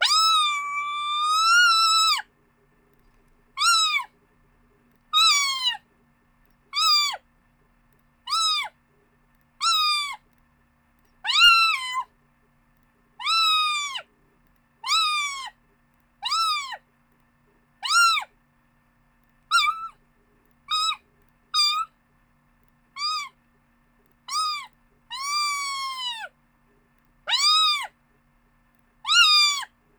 Sound effects > Animals
ANMLCat-Blue Snowball Microphone, CU Kitten, Meow Nicholas Judy TDC
A kitten meowing.